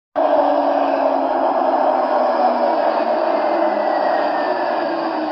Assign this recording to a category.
Sound effects > Vehicles